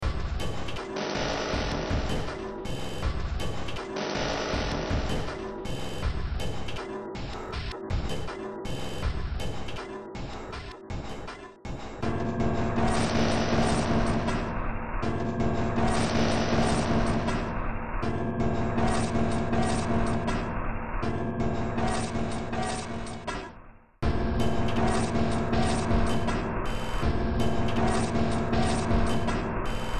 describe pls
Music > Multiple instruments
Short Track #3178 (Industraumatic)
Ambient, Cyberpunk, Games, Horror, Industrial, Noise, Sci-fi, Soundtrack, Underground